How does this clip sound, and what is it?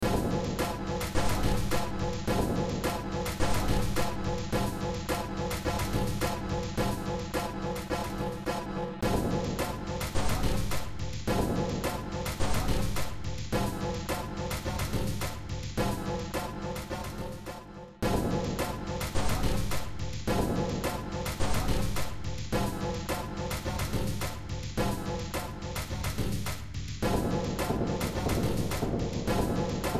Music > Multiple instruments
Short Track #3555 (Industraumatic)
Ambient, Cyberpunk, Games, Horror, Industrial, Noise, Sci-fi, Soundtrack, Underground